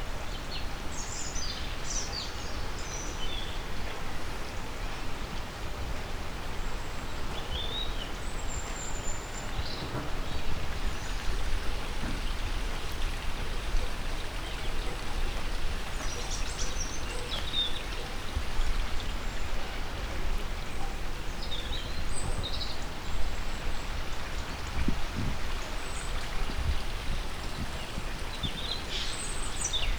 Soundscapes > Nature
20250312 Collserola River Birds Person Dog Calm Perfect Chill
Birds, Perfect, Person, Dog, Collserola, Chill, Calm, River